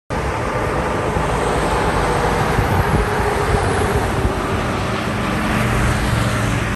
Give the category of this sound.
Sound effects > Vehicles